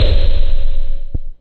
Instrument samples > Synths / Electronic
CVLT BASS 149
wavetable, lfo, wobble, bassdrop, sub, synth, lowend, subbass, low, drops, subs, bass